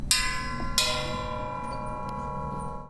Sound effects > Objects / House appliances
Junkyard Foley and FX Percs (Metal, Clanks, Scrapes, Bangs, Scrap, and Machines) 65
Bash, Dump, SFX, Environment, dumping, dumpster, FX, scrape, Junkyard, Robotic, Atmosphere, Junk, trash, Clank, Metallic, tube, Clang, rubbish, Metal, waste, Percussion, Smash, Machine, garbage, Bang, Foley, Robot, Ambience, Perc, rattle